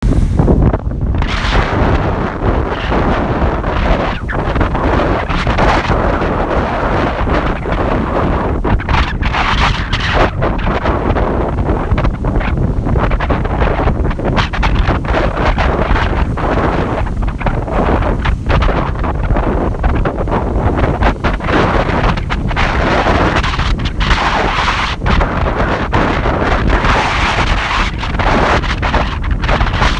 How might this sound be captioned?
Soundscapes > Other
This was supposed to be a recording of a windy beach, but as you can hear, something went wrong. The whole thing is filled with this electronic/artificial static interference, it sounds a bit like thunder or a record scratching. It creates an interesting soundscape. To me, it gives a vibe of overwhelming energy and intensity.